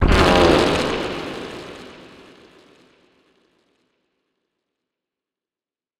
Sound effects > Electronic / Design
ALMIGHTY FART
This extremely sophisticated sound was the product of a lifetime of hard work and artistic growth. The preceding statement may not be true.